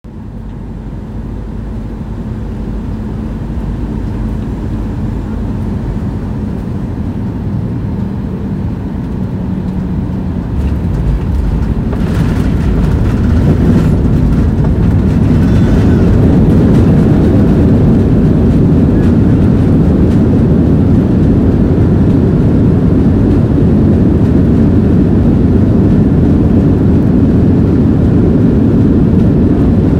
Sound effects > Vehicles
Commercial Jet Landing - Interior
Interior sound of a Southwest Airlines Boeing 737 commercial jet landing in Tulsa, OK.